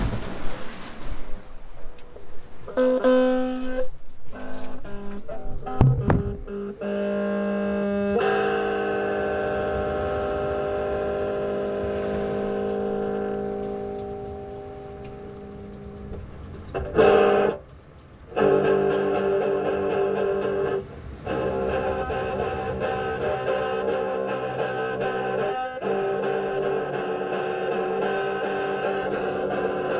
Soundscapes > Nature
bovisand beach, Plymouth
capturing the waves and the rain at Bovisand beach in Plymouth
rain, sea, waves, beach, field-recording, water